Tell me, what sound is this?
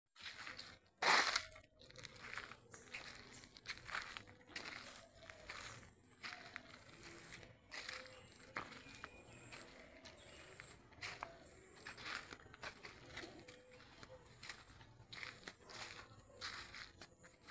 Sound effects > Human sounds and actions
The sound of walking in the garden, recorded from a mobile phone
Footsteps, Steps, Walking